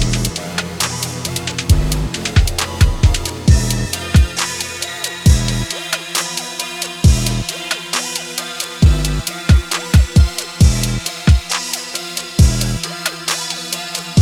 Music > Multiple instruments
Raw Distorted and Nasty 135BPM Trap Loop Idea
Made in FL11, basic random samples and kits.
evil, bells, distorted, 808, raw, trap, nasty